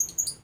Sound effects > Animals
Confused Bird Chirp
birb, bird, bird-chirp, bird-chirping, birdie, call, calling, chirp, chirping, indonesia, isolated, little-bird, short, single, subtle, whispering